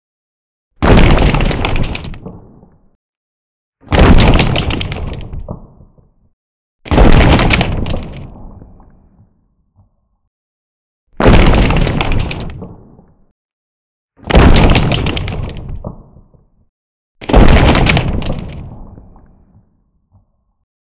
Sound effects > Natural elements and explosions
sounds of custom rock explosions as if someone is cracking the ground; destroying boulder or grabbing some building or boulder.